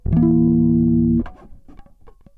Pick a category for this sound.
Music > Solo instrument